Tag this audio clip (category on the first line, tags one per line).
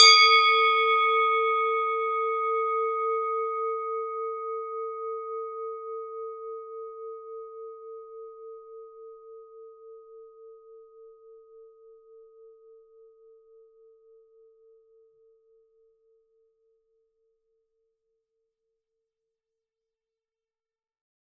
Instrument samples > Percussion
ding
close-up
bell
FR-AV2
one-shot
cowbell
rim-mic
oneshot
swiss-cowbell
Rode
NT5